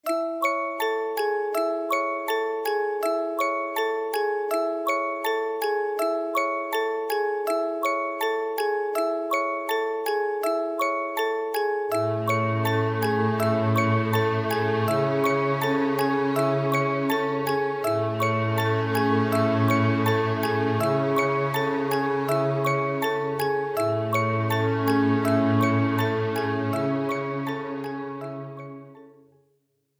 Music > Multiple instruments
Mysterious Cinematic Background
Mysterious and emotional composition with music box and strings, ideal for thrillers, tension, and dark drama.
atmosphere, background, box, cinematic, dark, dramatic, eerie, emotional, haunting, music, mysterious, mystery, storytelling, strings, suspense, tension, thriller